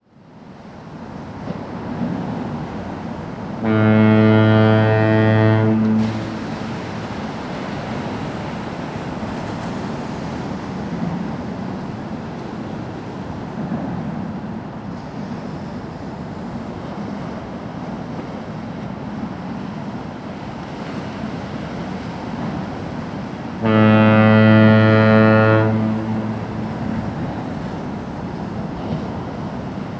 Soundscapes > Urban
The Golden Gate Bridge fog horn blowing to notify ships coming in and out of the San Francisco Bay. The ships are blowing horns as well. The waves are crashing along the shore and in the distance cars can be occasionally heard as they cross the bridge.
horn, waves, ambience, fog, fog-horn, boat